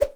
Sound effects > Objects / House appliances
A recording of a badminton racquet being swung in front of a microphone.
racquet, badminton, swipe, movement, fast, swish, whoosh, hard, air